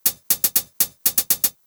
Music > Solo percussion
Hi Hat Closed
beat, drum, hihat